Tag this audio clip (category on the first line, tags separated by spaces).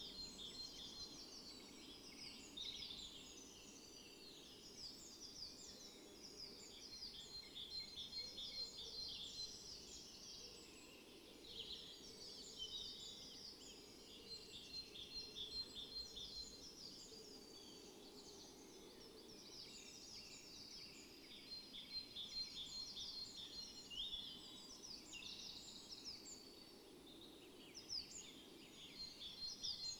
Soundscapes > Nature
alice-holt-forest artistic-intervention data-to-sound field-recording modified-soundscape nature phenological-recording raspberry-pi sound-installation soundscape weather-data